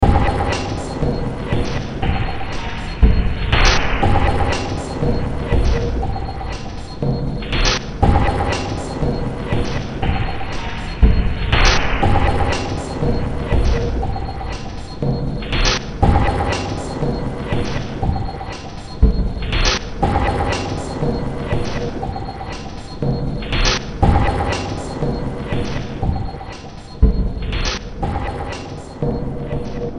Music > Multiple instruments
Demo Track #3008 (Industraumatic)
Ambient, Games, Horror, Noise, Sci-fi, Soundtrack, Underground